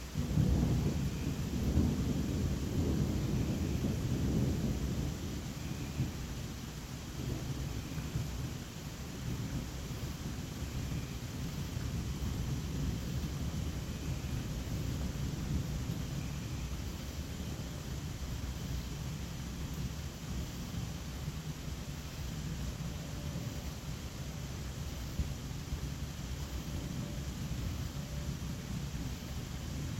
Sound effects > Natural elements and explosions
THUN-Samsung Galaxy Smartphone Thunder, Big, Rumble, Distant, Rain Nicholas Judy TDC
Big thunder rumbling in distance with rain.
rain, big, rumble, distance, thunder, Phone-recording